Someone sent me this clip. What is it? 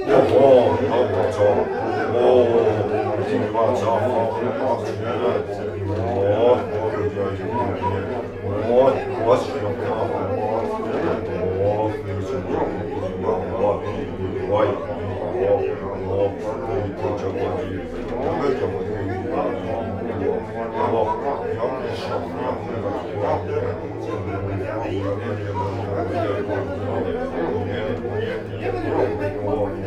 Speech > Conversation / Crowd
Mumbo Jumbo bus 1
FR-AV2, Tascam, processed, talking, XY, NT5, crowd, Rode, solo-crowd, indoor, chatter, mixed